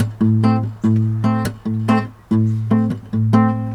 Music > Solo instrument
Acoustic Guitar Oneshot Slice 69
acoustic guitar oneshot shorts, knocks, twangs, plucks, notes, chords recorded with sm57 through audiofuse interface, mastered with reaper using fab filter comp
oneshot, chord, notes, note, foley, sfx, pluck, twang, acoustic, guitar, fx, string, knock, strings, plucked